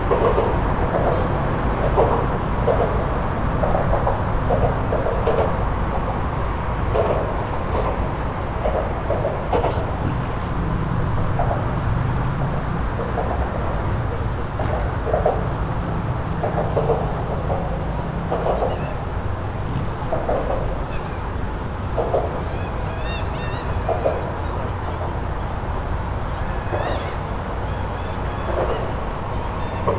Soundscapes > Urban
AMBSea-Summer Under the Perdido Pass Bridge, passing traffic, sea gulls, wind, morning QCF Gulf Shores Alabama Zoom H1n
Underneath the bridge at Perdido Pass, Alabama Gulf Coast. Overhead traffic, wind, gulls. Beach nearby
beach, bridge, seagulls, traffic, wind